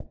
Sound effects > Human sounds and actions
Simple footstep on a soft floor Recorded with a Rode NT1 Microphone
simple, soft